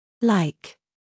Speech > Solo speech
to like
english, pronunciation, voice, word